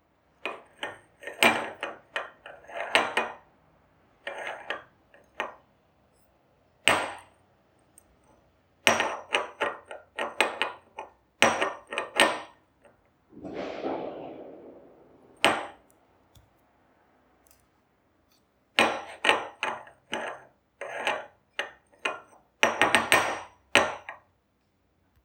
Sound effects > Objects / House appliances
A tea-cup and its plate touching eachother, a lot like the sounds you listen at a tea party
cup, mug, porcelain